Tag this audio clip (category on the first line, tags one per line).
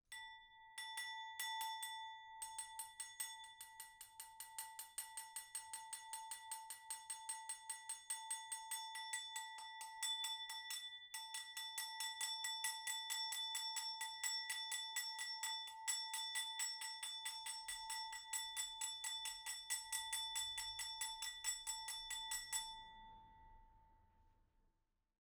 Sound effects > Other
Rode
indoor
individual
single
XY
stemware
clinging
NT5
person
cling
wine-glass
glass
solo-crowd
applause
Tascam
FR-AV2